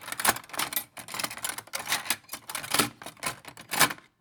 Sound effects > Objects / House appliances
knives handling3
cutlery, knives, silverware